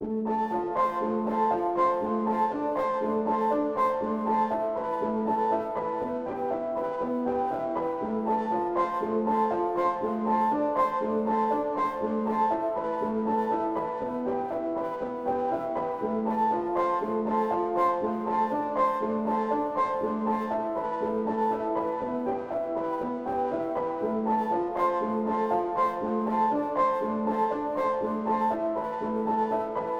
Music > Solo instrument
Piano loops 194 efect 3 octave long loop 120 bpm
120, 120bpm, free, loop, music, piano, pianomusic, reverb, samples, simple, simplesamples